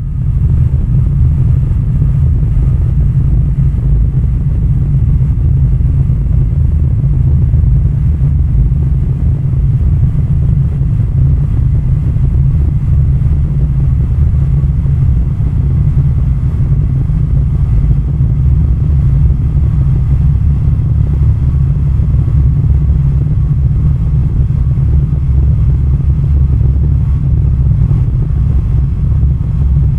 Sound effects > Experimental
"Our sensors could only pick up radiation and static. Whatever happened here is long finished." For this upload I used a Zoom H4n multitrack recorder to capture various ambient sounds in my home. I then imported said data into Audacity where I put together this final audio file.